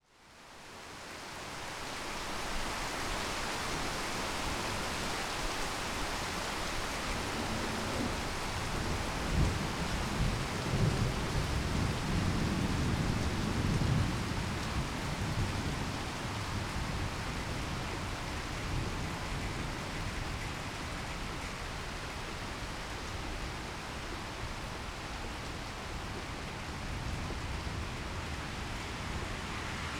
Soundscapes > Urban
250614 003840 FR Suburban thunderstorm fading away
Suburban thunderstorm fading away. I made this recording from my balcony, located in Nanterre (suburbs of Paris, France), during a hot evening of June. First, one can hear heavy rain with thunder-strikes, and some vehicles passing by in the wet street. Then, the rain becomes progressively lighter, and the thunderstorm fades away gradually. Recorded in June 2025 with a Zoom H5studio (built-in XY microphones). Fade in/out applied in Audacity.